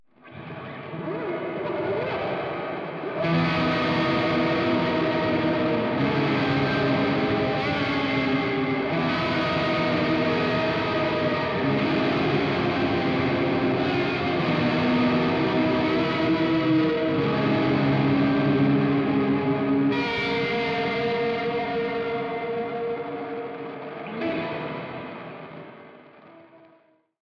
Music > Solo instrument
super noisy weird shoegaze guitar 87bpm
I have fun playing with my old FAIM stratocoaster and my pedalboard. Only dreammy shoegazing noisy people gonna love it My pedalboard Behringer graphic eq700 Cluster mask5 Nux Horse man Fugu3 Dédalo Toxic Fuzz Retrohead Maquina del tiempo Dédalo Shimverb Mooer Larm Efectos Reverb Alu9 Dédalo Boss Phase Shifter Mvave cube baby 🔥This sample is free🔥👽 If you enjoy my work, consider showing your support by grabbing me a coffee (or two)!
distorsion, heavy, noise, shoegaze